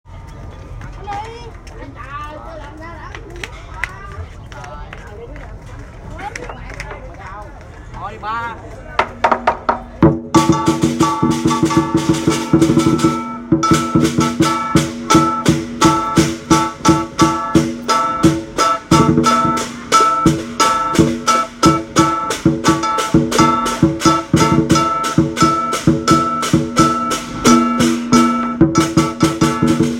Music > Multiple instruments
Múa Lân 6 - 麒麟 Qí Lín 6
Musica for kỳ lân dance (麒麟 qí lín). Kids talk before start dance. Record use iPhone 7 smart phone. 2025.05.12 07:47
dance, music, qi-lin